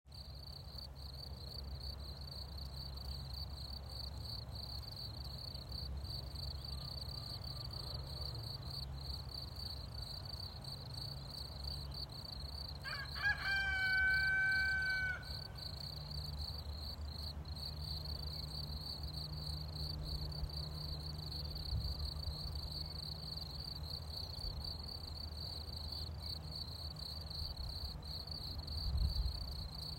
Soundscapes > Nature
Windy conditions with rooster sound